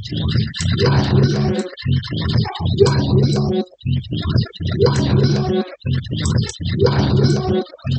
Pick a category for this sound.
Sound effects > Electronic / Design